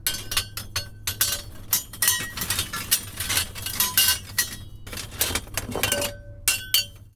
Sound effects > Objects / House appliances
Junkyard Foley and FX Percs (Metal, Clanks, Scrapes, Bangs, Scrap, and Machines) 139
Ambience, Atmosphere, Bang, Bash, Clang, Clank, Dump, dumping, dumpster, Environment, Foley, FX, garbage, Junk, Junkyard, Machine, Metal, Metallic, Perc, Percussion, rattle, Robot, Robotic, rubbish, scrape, SFX, Smash, trash, tube, waste